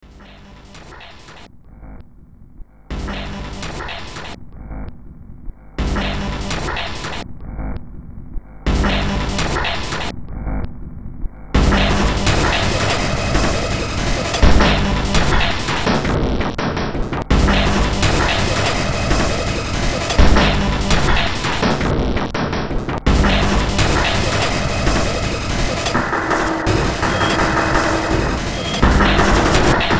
Music > Multiple instruments
Demo Track #3607 (Industraumatic)
Horror, Noise, Cyberpunk, Soundtrack, Underground, Sci-fi, Games, Ambient, Industrial